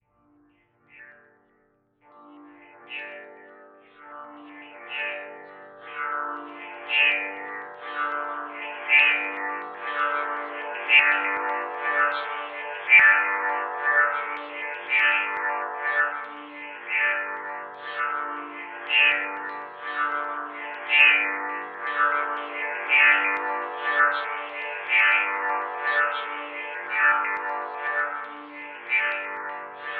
Music > Other
building pulsating sound
ambient, experimental, rhythmic